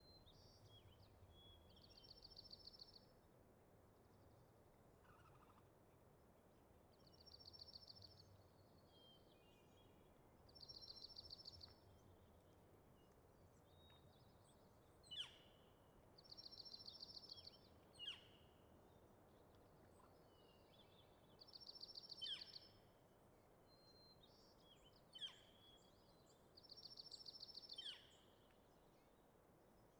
Soundscapes > Nature
AMBLake DorothyLake Dusk, Birds, Lapping Water, Distant Wind ShaneVincent PCT25 20250718
General lake ambience, taken at dusk. Birds, lapping water. This recording, along with the others in this pack, were taken during a 50-day backpacking trip along a 1000 mile section of the Pacific Crest Trail during the summer of 2025. Microphone: AKG 214 Microphone Configuration: Stereo AB Recording Device: Zoom F3 Field Recorder
birds, dusk, lake